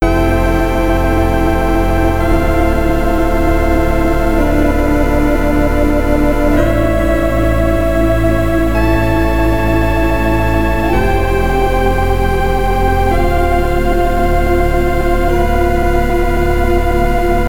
Music > Multiple instruments
Ethereal voice sample chords
Figure I'd share it here, sounds nice with some really extra caked reverb (I love it with wet sound at 100% with the biggest room size setting on your plugin of choice). Might be nice for a pad type of thing if you want to chop it and re-sample
angelic, sample, synth, heaven, choir